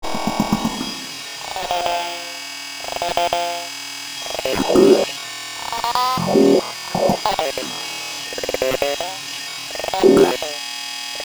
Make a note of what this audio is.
Sound effects > Electronic / Design
Alien Droid Bugs and Automana
From a collection of robotic alien glitch fx, sounds like animals insects and bugs from another planet. Some droning landscape glitch effects made with Fl studio, Wavewarper 2, Infiltrator, Shaperbox, Fabfilter, Izotope, processed via Reaper
Abstract, Creature, Trippin, Droid, Neurosis, Noise, Robotic, Automata, Digital, Creatures, Experimental, Synthesis, Analog, Alien, Drone, Glitch, FX, Spacey, Trippy, Mechanical, Otherworldly, Buzz